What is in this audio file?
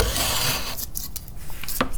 Sound effects > Other mechanisms, engines, machines
Woodshop Foley-025
bam, bang, boom, bop, crackle, foley, fx, knock, little, metal, oneshot, perc, percussion, pop, rustle, sfx, shop, sound, strike, thud, tink, tools, wood